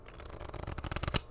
Sound effects > Objects / House appliances
GAMEMisc-Blue Snowball Microphone Cards, Shuffle 08 Nicholas Judy TDC
Cards being shuffled.
foley, shuffle, cards, Blue-brand, Blue-Snowball